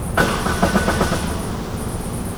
Sound effects > Vehicles
Car Not Starting and Cricket Noise
A car failing to start at night, down in the street, recorded from a window. Zoom H2n MS recording.